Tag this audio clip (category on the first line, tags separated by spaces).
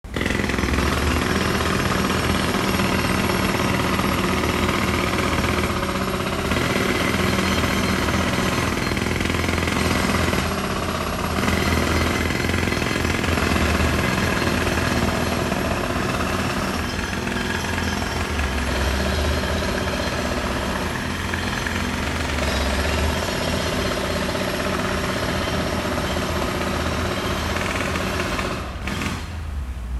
Sound effects > Other mechanisms, engines, machines
jackhammer construction jack-hammer